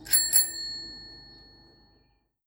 Sound effects > Vehicles
A bicycle bell ringing.